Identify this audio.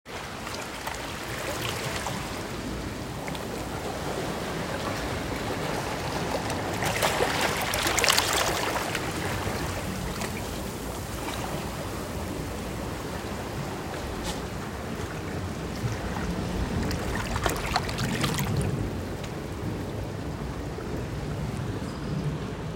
Soundscapes > Nature
Waves and wind
Wind noise in Calanques National Park and waves.